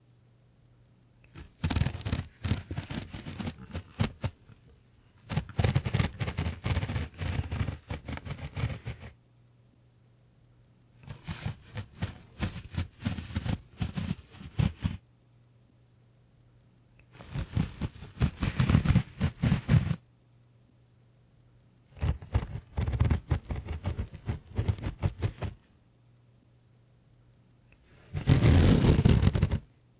Sound effects > Other
Zipper Closeup, Zipping and Unzipping with Minor Fabric Sounds
Processed to reduce dynamic range and clean the audio, meant to be friendly for ASMR and VA creators to use as gentle SFX! Recorded on a Neat King Bee v1 into a Zoom H5.
coat, undress, zip, jeans, jacket, clothes, zipper, unzip, pants, fly, clothing, fabric, dress